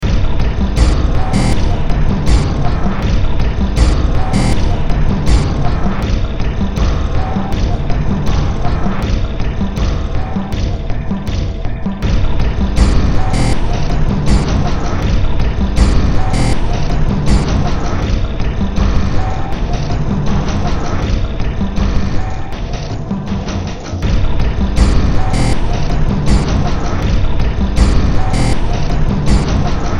Music > Multiple instruments
Short Track #3121 (Industraumatic)
Games, Industrial, Soundtrack, Cyberpunk, Noise, Ambient, Sci-fi